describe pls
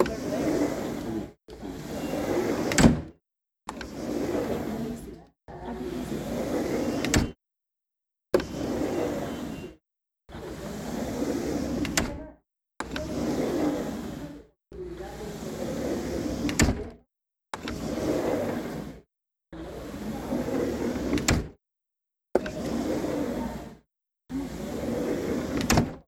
Sound effects > Objects / House appliances
DOORSlid-Samsung Galaxy Smartphone, CU Fiberglass Patio Door, Slide Open, Close Nicholas Judy TDC
A fiberglass patio door opening and closing. Recorded at Lowe's.
close, foley, fiberglass, patio, open, Phone-recording, door